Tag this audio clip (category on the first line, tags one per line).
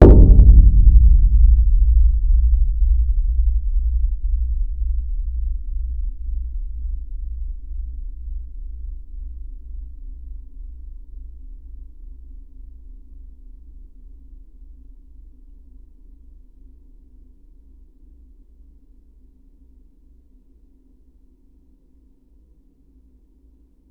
Sound effects > Objects / House appliances
field-recording
gong
percussion
hit
metallic
fence
metal
impact